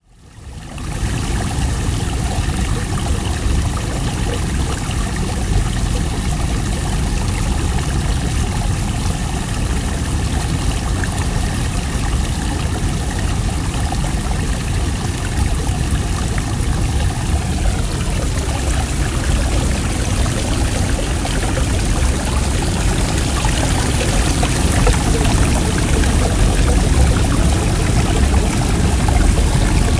Sound effects > Natural elements and explosions
Nescopeck State Park
Stream, Water, Field-Recording